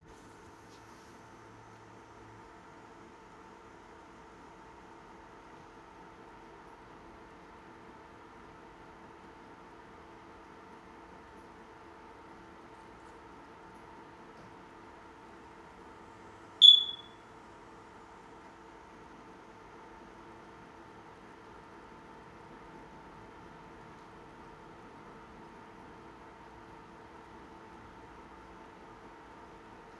Sound effects > Objects / House appliances
mrental maintenance help me own sound recorded with iphone 16 pro voice memos app